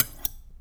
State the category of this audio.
Sound effects > Objects / House appliances